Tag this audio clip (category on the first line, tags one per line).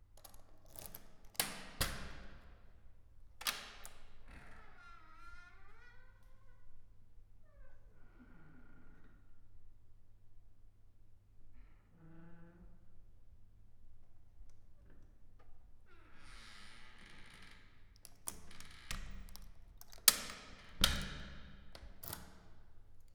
Sound effects > Objects / House appliances
attic
lock
opening